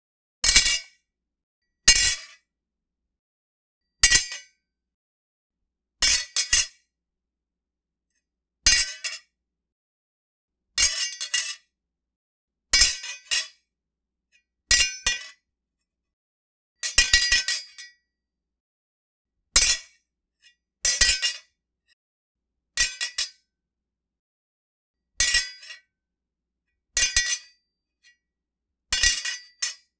Sound effects > Objects / House appliances
custom sword drop sound 07222025
sounds of swords or knives being dropped on hard surface.
swords, kung-fu, drop, TMNT, battle, combat, fighting, hard, foley, dropping, duel, metallic, weapon, medieval, martialarts, scatter, knight, metal, weapons, blade, melee, attack, knife, karate, surface, fight, sword